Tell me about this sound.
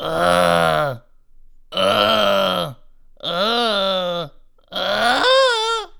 Sound effects > Human sounds and actions
wailing sounds
human, sad, clown